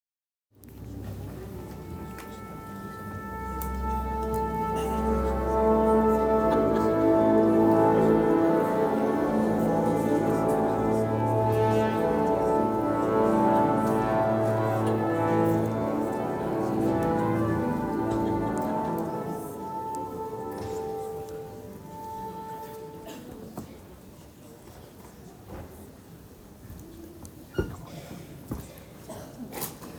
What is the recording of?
Soundscapes > Indoors
BBBC Orchestra Tune up Usher Hall
Scottish BBC Orchestra tuning up for Rufus Wainwright concert in the Usher Hall, Edinburgh. 20 September 2025. Recorded on an iPhone 11 from midway in the stalls. Only processing is fade in/out
ScottishBBCOrchstra, UsherHall, Orchestratuneup